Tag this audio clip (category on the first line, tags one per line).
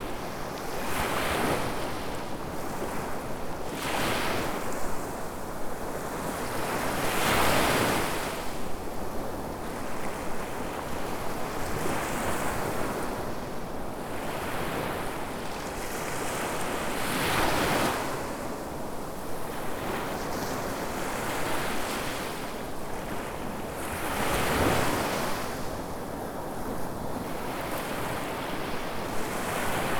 Soundscapes > Nature
ambience
beach
field-recording
northern-sea
sea
water
waves